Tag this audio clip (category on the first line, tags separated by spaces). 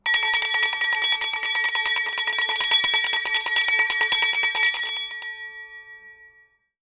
Sound effects > Objects / House appliances
Blue-brand hand shake